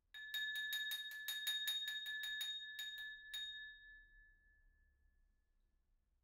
Sound effects > Other
Glass applause 21
glass, stemware, solo-crowd, cling, XY, person, clinging, Rode, FR-AV2, NT5, wine-glass, individual, applause